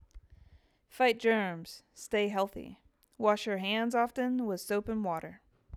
Speech > Solo speech
PSA – Wash Your Hands
A health-focused PSA reminding everyone of the importance of hand hygiene. Script: "Fight germs, stay healthy. Wash your hands often with soap and water."
CleanHands, WashYourHands, PublicHealth, StayHealthy, HealthTips, PSA, GermFree